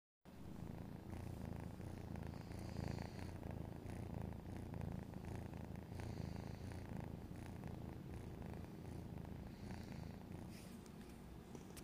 Sound effects > Animals
A recording of my cat purring
cat, kitten